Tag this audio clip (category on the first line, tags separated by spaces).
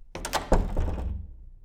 Sound effects > Objects / House appliances
close creak door